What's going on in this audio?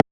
Instrument samples > Synths / Electronic
A wood-like percussion made in Surge XT, using FM synthesis.
synthetic, fm